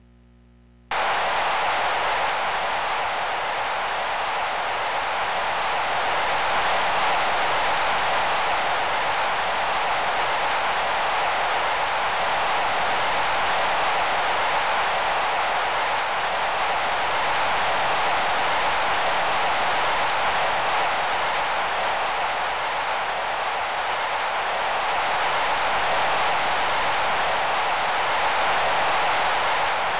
Sound effects > Objects / House appliances
Static 2 (Grittier)
Processed white noise that sounds like radio static. Originally made for a play.
Electronics, Radio, Static